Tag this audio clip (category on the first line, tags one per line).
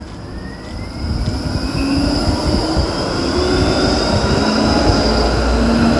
Sound effects > Vehicles
vehicle
tramway
tram
outside